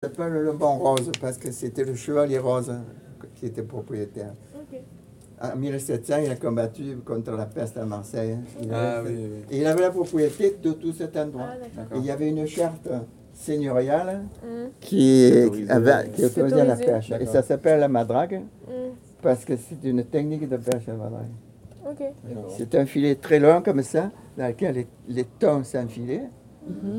Speech > Conversation / Crowd
old man talking with us